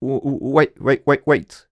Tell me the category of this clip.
Speech > Solo speech